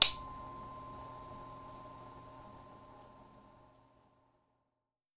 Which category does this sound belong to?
Music > Solo percussion